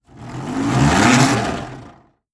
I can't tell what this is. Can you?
Sound effects > Objects / House appliances
TOYMech-Samsung Galaxy Smartphone, CU Fire Truck, Pass By Nicholas Judy TDC
A toy fire truck passing by. Recorded at Goodwill.